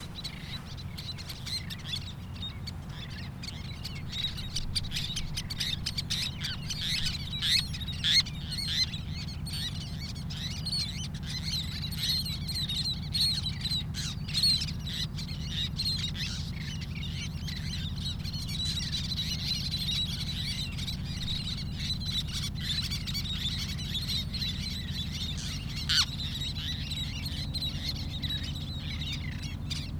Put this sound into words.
Soundscapes > Nature
A Migratory Bird nesting site on the beach at Perdido Pass on the Alabama Gulf Coast. Nearby boat traffic, car traffic, waves and wind.